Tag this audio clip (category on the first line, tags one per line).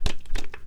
Sound effects > Objects / House appliances
carton
clack
click
foley
industrial
plastic